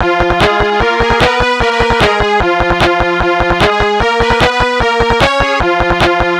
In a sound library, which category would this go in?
Music > Multiple instruments